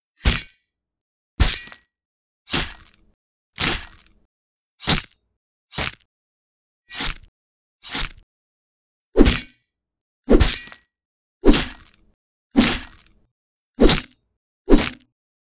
Sound effects > Objects / House appliances
MCU corvus glaive inspired sword stab sound FINAL 07162025
customs sounds of sword stabs inspired by avengers infinity war for when corvus glaive uses his double edge pole arm to kill and stab his opponents.